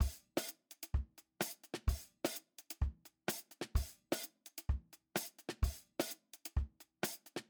Music > Solo percussion
Short pitched loop 128 BPM in 4
recording, live